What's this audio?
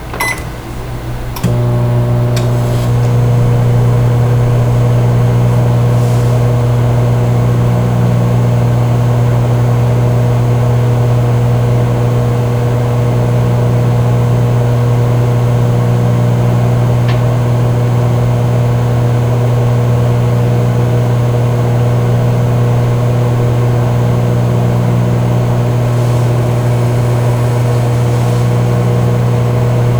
Sound effects > Objects / House appliances
To record this sound effect I placed a Rode M5 microphone (attached to a Zoom H4n multitrack recorder) in front of a conventional kitchen microwave, and let it run for two minutes. I then took the raw sound file and used Audacity to prepare the audio for uploading and sharing.
audacity, buzzing, humming, microwave, radiation, zoom-h4n